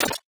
Instrument samples > Percussion
Glitch-Perc-Glitch Cymbal 10
Just retouched some cymbal sample from FLstudio original sample pack. Ramdomly made with Therapy, OTT, Fruity Limiter, ZL EQ.